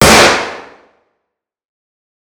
Sound effects > Natural elements and explosions
Kalashnikov 2 (indoor)
(2/2) Gunshot of an AK47 fired at the top of a staircase, cleaned up and detailed in post. Recorded using phone microphone.
gunshot, bang, shoot, weapon, gun, shooting